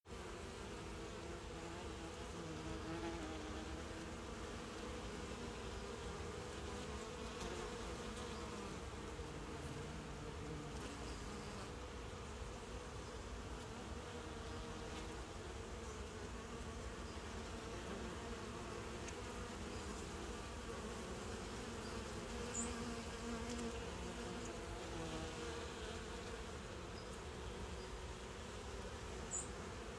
Soundscapes > Nature
Bees on ivy 10/03/2023
Bees pollinating ivy